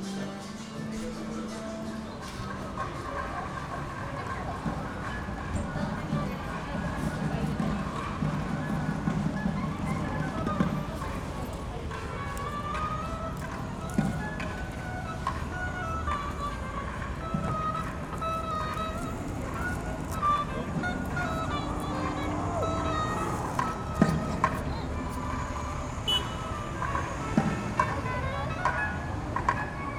Soundscapes > Urban
Street sounds in downtown Chicago, including a drummer playing buckets, a saxophonist, cars, street noise.